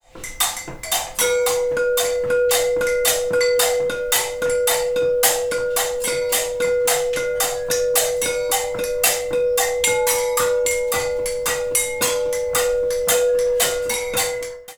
Music > Multiple instruments
Batucada con ceramica lejana
Batucada made with different clay percussion instruments recorded from afar with a Zoon H5. Embarrados was a community group from Pando, Uruguay, focused on culture and social change. They organized workshops, music events, and urban gardening to strengthen local ties. Their name meant "dirty with clay" reflecting their hands-on community work. They are ceramics made of clay and built by themselves.
batucada, field-recording, PERCUSIVE, uruguay